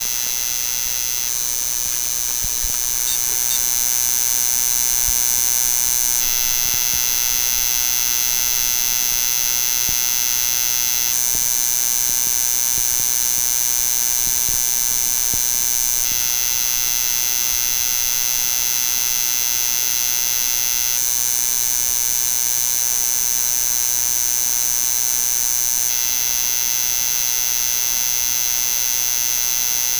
Sound effects > Objects / House appliances
Electromagnetic field recording of a Bose Soundtouch speaker Electromagnetic Field Capture: Electrovision Telephone Pickup Coil AR71814 Audio Recorder: Zoom H1essential